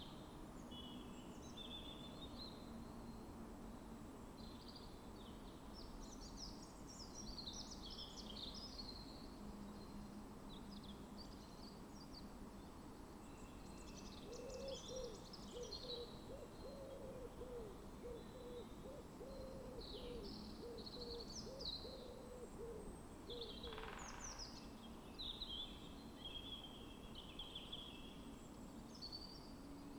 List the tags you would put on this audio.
Soundscapes > Nature
alice-holt-forest
data-to-sound
Dendrophone
field-recording
modified-soundscape
natural-soundscape
nature
phenological-recording
raspberry-pi
weather-data

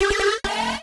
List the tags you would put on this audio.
Sound effects > Electronic / Design
alert
button
Digital
interface
menu
notification
options
UI

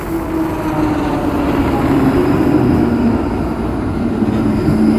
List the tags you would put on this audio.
Sound effects > Vehicles
tramway
vehicle
transportation

